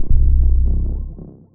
Instrument samples > Synths / Electronic
CVLT BASS 24
bass, bassdrop, clear, drops, lfo, low, lowend, stabs, sub, subbass, subs, subwoofer, synth, synthbass, wavetable, wobble